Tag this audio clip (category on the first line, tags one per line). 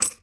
Sound effects > Other
glint
interface
metallic
cash
jingle
game
ui
change
money
ring
small
coins
loose